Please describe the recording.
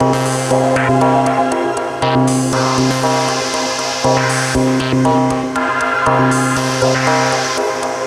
Music > Solo instrument
119 D# CasioSK1Texture 01
Texture, Loop, Vintage